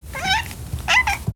Sound effects > Animals
ANMLCat happy meow collar jingles ECG FCS2
My cat is very talkative and I recorded his happy meows saying "ñam ñam"
jingles, happy